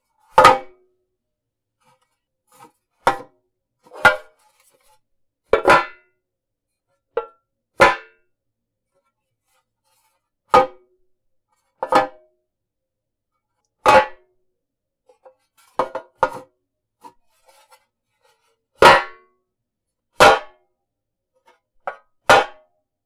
Sound effects > Objects / House appliances
metal bread pan
Picking up and dropping a metal bread form on a countertop.
hit, metal, drop, impact, bread-pan